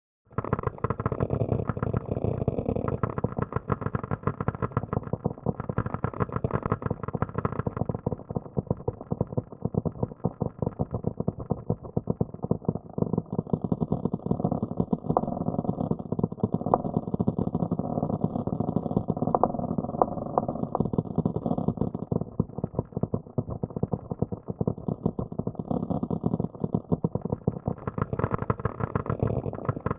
Soundscapes > Synthetic / Artificial
Scratching Plastic Texture
I recorded the sound of some plastic scratching in my hand and converted it into this background texture
ambient, background, granular, Plastic, soundscape, texture